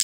Sound effects > Objects / House appliances
Could just be a general click. I'm using it for a pistol trigger. Sound was made using the lever of a nail clipper falling back down into its rest position.
pistol, click